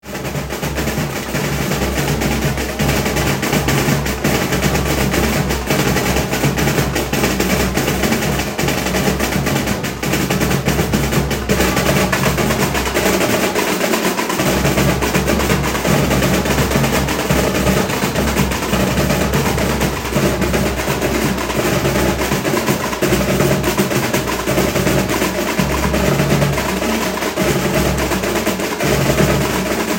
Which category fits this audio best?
Soundscapes > Urban